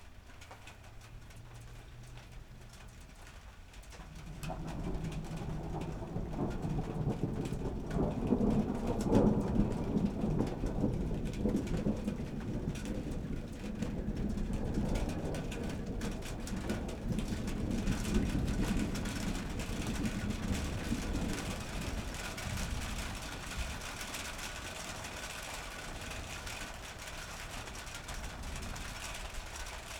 Sound effects > Natural elements and explosions
RAINMetl 32bF Approaching Storm Metal Roof Outdoors
Sound of an approaching storm, rain light to heavy on a metal roof, near and far thunder recorded from the outside so no echo or reverb of the rain on the metal roof recorded with a Zoom H6